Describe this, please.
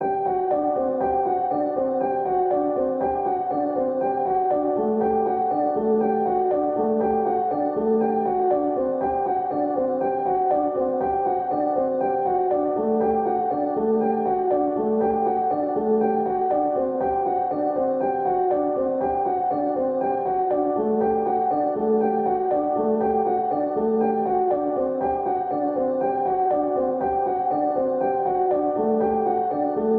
Music > Solo instrument
Piano loops 094 efect 4 octave long loop 120 bpm
120, 120bpm, loop, pianomusic, samples, simple, simplesamples